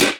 Instrument samples > Percussion
hi-hatized crash 20'' Sabian Vault Artisan short
hat-cymbal, percussion, facing-cymbals, bronze, Sabian, cymbal-pedal, metal, closed-hat, picocymbal, minicymbal, Istanbul, Meinl, brass, crisp, metallic, snappy-hats, Zildjian, closed-cymbals, hat-set, drum, Paiste, chick-cymbals, dark-crisp, hat, tick, hi-hat, dark, click, Bosporus, drums